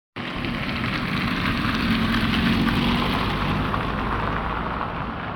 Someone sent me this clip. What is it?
Sound effects > Vehicles
seat ibiza
Car, field-recording, Tampere